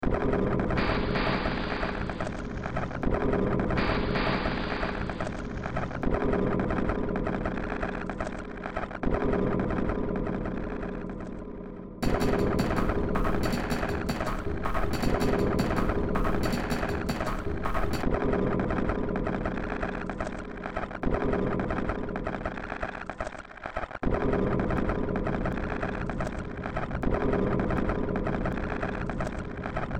Music > Multiple instruments
Short Track #3452 (Industraumatic)
Ambient, Cyberpunk, Games, Horror, Industrial, Noise, Sci-fi, Soundtrack, Underground